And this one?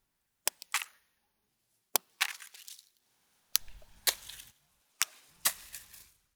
Sound effects > Natural elements and explosions
light falling cone on leaves
Dropped cone on the leaves. Location: Poland Time: November 2025 Recorder: Zoom H6 - XYH-6 Mic Capsule
autumn, field-recording, hit, impact, leaves, pine-cone, stereo